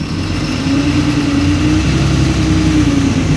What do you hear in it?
Sound effects > Vehicles
bus takeoff
MAN/Solaris bus taking off from a bus stop, interior.
bus, engine, drive, motor, driving, vehicle